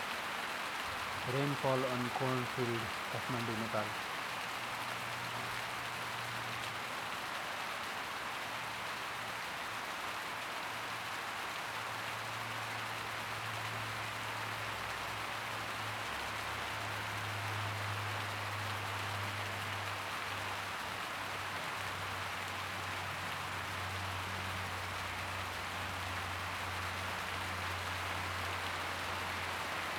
Soundscapes > Nature
AMB-Rainfall at a corn field, Kathmandu, Nepal - 26Jun2025,1925H
An evening rainfall on a corn field. Recorded with Shure Motiv microphone connected to an iPhone.
Corn, AMB, Nepal, Rain, Field, Kathmandu